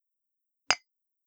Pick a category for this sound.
Sound effects > Objects / House appliances